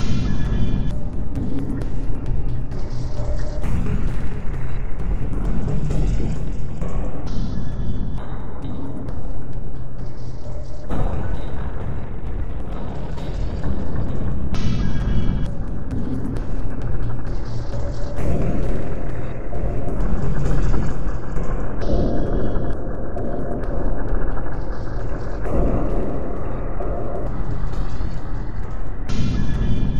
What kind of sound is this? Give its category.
Soundscapes > Synthetic / Artificial